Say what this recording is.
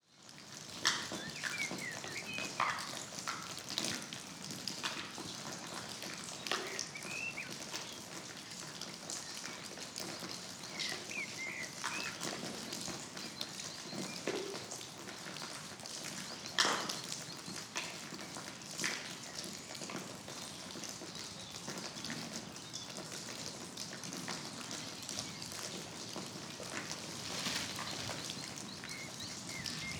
Soundscapes > Urban
INDUSTRIAL WATER DROP kengwai cct
Ambient recording from an abandoned plant in the Charleroi region (25/5/25). Recorded by two Earsight standard Immersive Soundscapes microphones and a Sound Devices Mixpre6
drop, ambient, wind, factory, abandoned, soundscape, water, field-recording